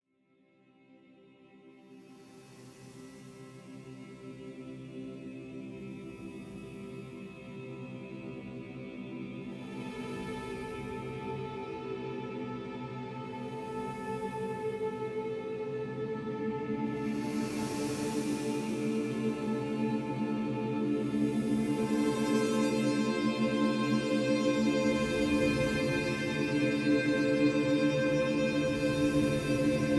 Soundscapes > Synthetic / Artificial
Complex shifting ambient drone 1
Derived from three minutes of live playing by a space-rock trio, timestretched and processed. Use as an accompaniment as is or sample/re-edit/reprocess as you wish. This material is freely offered for any purpose, but a message in the comments about how you made use of it would be of interest.
accompaniment, background, backing